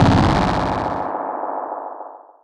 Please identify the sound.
Instrument samples > Synths / Electronic
CVLT BASS 101
bass, bassdrop, clear, drops, lfo, low, lowend, stabs, sub, subbass, subs, subwoofer, synth, synthbass, wavetable, wobble